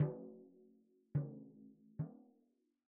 Music > Solo percussion
Med-low Tom - Oneshot 54 12 inch Sonor Force 3007 Maple Rack
flam, roll, drumkit, maple, acoustic, Medium-Tom, drum, drums, toms, perc, recording, Tom, tomdrum, realdrum, kit, oneshot, quality, real, percussion, beat, wood, loop, med-tom